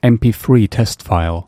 Speech > Solo speech
"Em Pee Three Test File" spoken by yours truly. Recording: Shure SM7B → Triton FetHead → UR22C → Audacity, some compression and normalization applied.